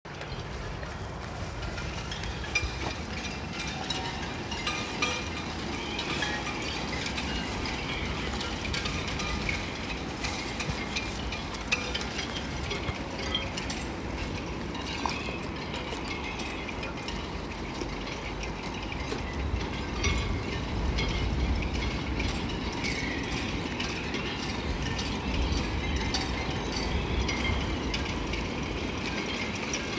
Soundscapes > Other
A windy day rocking small yachts in the marina causing masts and rigging to clang. Recorded on zoom h2n,